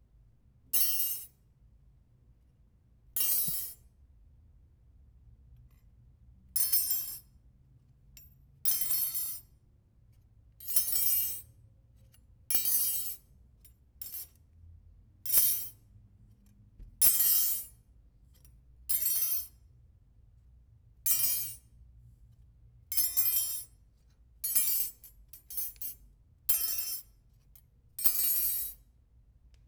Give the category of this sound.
Sound effects > Objects / House appliances